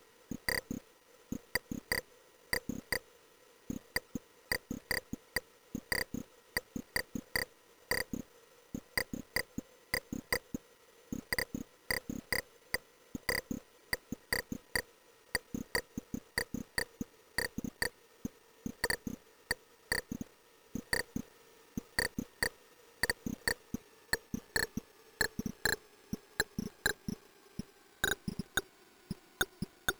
Sound effects > Electronic / Design
Creepy tech glitching / freezing + powering down
Made with circuit bent toy going into a simple interface. Weird hypnotic glitching sounds, followed by winding down and back up. And two weird sounds towards the end.
circuit-bent, digital, experimental, future, glitch, robot, sci-fi, sound-design, tech, toy, weird